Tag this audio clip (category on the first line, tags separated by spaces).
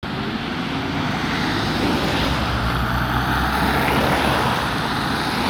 Soundscapes > Urban
car engine vehicle